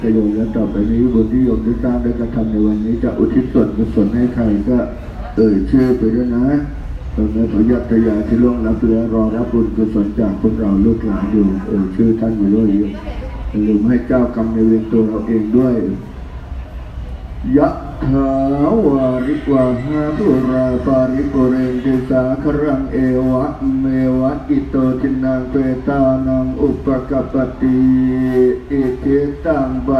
Soundscapes > Urban
Monk's Voice at Wat Arun, Bangkok, Thailand (Feb 23, 2019)
Field recording of a monk speaking softly at Wat Arun temple in Bangkok. Includes temple reverb and surrounding ambient noise.
Bangkok, Thailand, echo, human, spiritual, ambient, temple, Wat, voice, Arun, monk